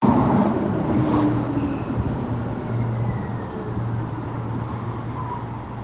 Sound effects > Vehicles
Tram arriving at a public transport platform. Recorded on the platform with the default device microphone of a Samsung Galaxy S20+ TRAM: ForCity Smart Artic X34